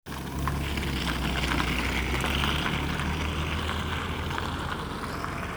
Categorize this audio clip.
Soundscapes > Urban